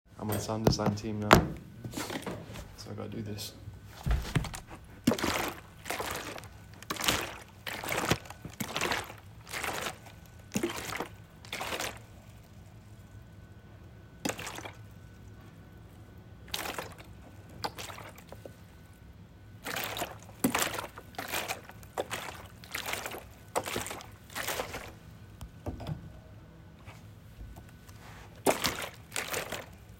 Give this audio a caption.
Sound effects > Natural elements and explosions
Walking in water
Splish sploosh water sound :D